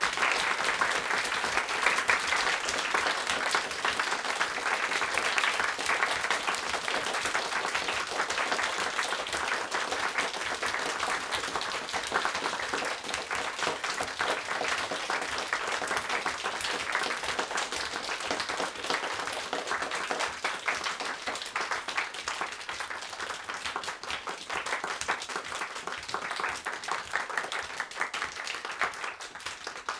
Sound effects > Human sounds and actions
Applause bus
applause, cheer, clap, crowd, FR-AV2, freesound20, indoor, mixed, NT5, processed, Rode, solo-crowd, Tascam, XY